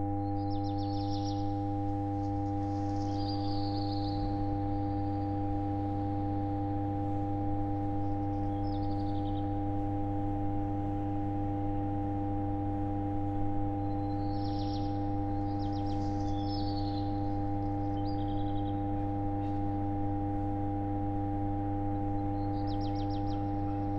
Sound effects > Other mechanisms, engines, machines
250607 05h10ish Electrical clauset Albi arret Strasbourg
Subject : Sound of the electricla clauset in Albi near the Strasburg stop. Date YMD : 2025 06 07 (Saturday). Early morning. Time = Location : Albi 81000 Taarn Occitanie France. Hardware : Tascam FR-AV2, Rode NT5 with WS8 windshield. Had a pouch with the recorder, cables up my sleeve and mic in hand. Weather : Grey sky. Little to no wind, comfy temperature. Processing : Trimmed in Audacity. Other edits like filter, denoise etc… In the sound’s metadata. Notes : An early morning sound exploration trip. I heard a traffic light button a few days earlier and wanted to record it in a calmer environment.
2025, 81000, Albi, buzz, buzzing, City, Early, Early-morning, electric, electrical, France, FR-AV2, hand-held, handheld, hum, Mono, morning, NT5, Occitanie, Outdoor, Rode, Saturday, Single-mic-mono, Tarn, Tascam, Urbain, Wind-cover, WS8